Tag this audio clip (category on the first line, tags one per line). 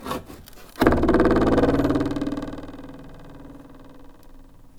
Sound effects > Other mechanisms, engines, machines
foley
fx
handsaw
hit
household
metallic
perc
saw
sfx
shop
tool
twangy
vibe
vibration